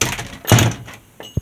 Sound effects > Objects / House appliances
Entrance door being closed. Recorded with my phone.